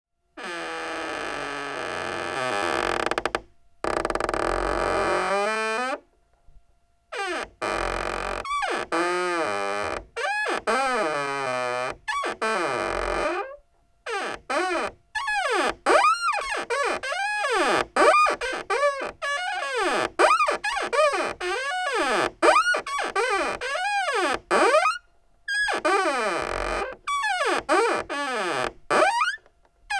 Sound effects > Objects / House appliances
Micro de contacto crujido puerta
Melodic sound of a door creek. Recorded with a Contact Microphone..
door, creek, mic, contact, montevideo, uruguay